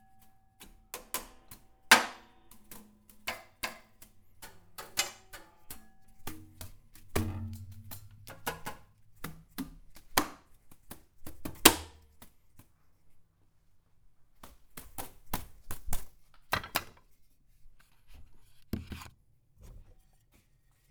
Sound effects > Objects / House appliances
paint brush drum beat foley-003
using paint brush to make perc sounds and beats
bristles, soft, paint, paintbrush, bristle, wiping, shop, sfx, brushing, delicate, surface, brush